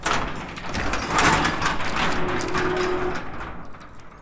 Sound effects > Objects / House appliances
bay door jostle metal-003
bonk, clunk, drill, foley, fx, glass, hit, industrial, natural, object, oneshot, percussion, sfx, stab